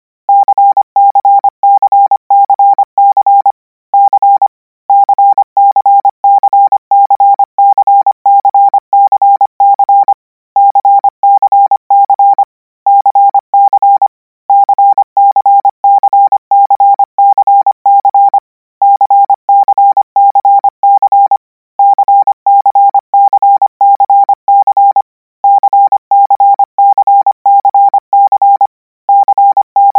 Sound effects > Electronic / Design
Koch 35 C - 200 N 25WPM 800Hz 90%
Practice hear letter 'C' use Koch method (practice each letter, symbol, letter separate than combine), 200 word random length, 25 word/minute, 800 Hz, 90% volume.